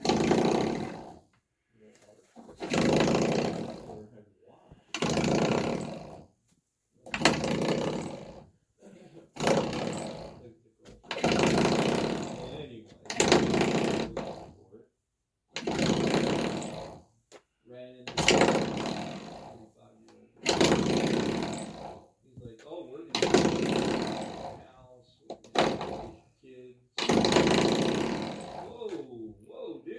Sound effects > Objects / House appliances
TOONTwang-Samsung Galaxy Smartphone, CU Springboard Nicholas Judy TDC
A springboard twang.
cartoon, springboard